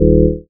Instrument samples > Synths / Electronic
WHYBASS 2 Eb
Synthesized instrument samples